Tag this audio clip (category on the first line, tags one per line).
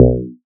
Instrument samples > Synths / Electronic
bass
fm-synthesis
additive-synthesis